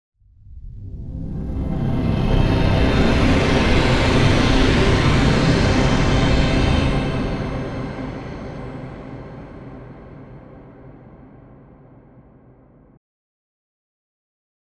Instrument samples > Synths / Electronic
Deep Pads and Ambient Tones5
From a collection of 30 tonal pads recorded in FL Studio using various vst synths